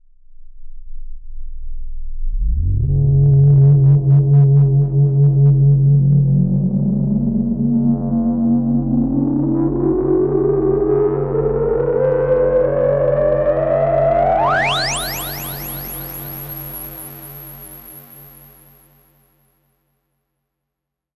Sound effects > Experimental
Analog Bass, Sweeps, and FX-035
alien, mechanical, electronic, snythesizer, basses, analog, vintage, sample, analogue, effect, machine, fx, sci-fi, complex, dark, scifi, weird, retro, electro, sweep, robotic, sfx, robot